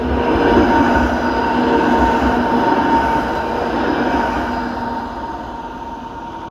Soundscapes > Urban
ratikka17 copy
The tram driving by was recorded In Tampere, Hervanta. The sound file contains a sound of tram driving by. I used an Iphone 14 to record this sound. It can be used for sound processing applications and projects for example.
traffic, vehicle